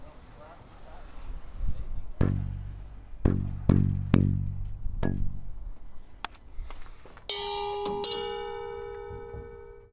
Sound effects > Objects / House appliances
Junkyard Foley and FX Percs (Metal, Clanks, Scrapes, Bangs, Scrap, and Machines) 166
scrape, Dump, Machine, Metal, rattle, trash, Clang, Bash, Metallic, Atmosphere, FX, Percussion, Environment, Ambience, Junk, Junkyard, dumpster, Robot, Perc, Clank, SFX, tube, Foley, waste, rubbish, garbage, Robotic, Smash, dumping, Bang